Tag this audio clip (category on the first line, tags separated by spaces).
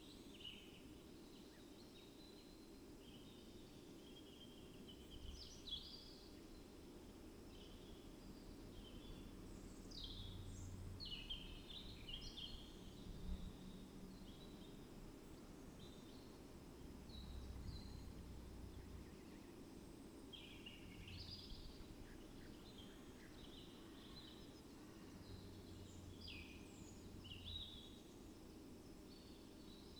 Soundscapes > Nature
weather-data natural-soundscape field-recording nature raspberry-pi soundscape sound-installation Dendrophone alice-holt-forest modified-soundscape artistic-intervention phenological-recording data-to-sound